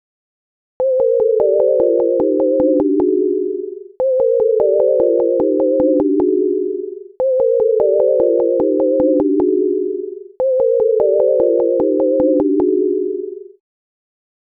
Music > Other
This music reflects the loss of hope following an event. The decline in emotions, mood, etc... Depression, suicide, etc... This song represents the mood of anyone; it can happen to any of us that our mood is lower than usual, like you, like me, brothers, sisters, parents... We can all experience low moods. The best solution is to talk to your dad, mom, brother, sister, friend, best friend, a close relative, or a psychologist if the problem is serious.